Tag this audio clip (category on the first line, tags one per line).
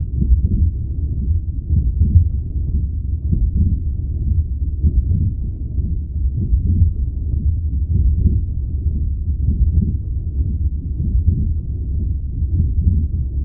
Sound effects > Objects / House appliances
geophone
laundry
leaf-audio
low-frequency
seismic
washer
washing